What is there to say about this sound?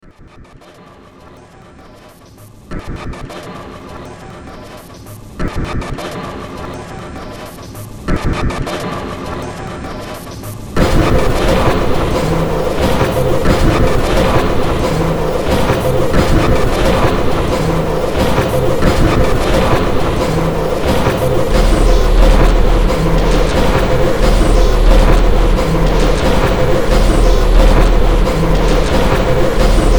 Music > Multiple instruments
Demo Track #3904 (Industraumatic)
Ambient, Cyberpunk, Horror, Industrial, Noise, Sci-fi, Soundtrack, Underground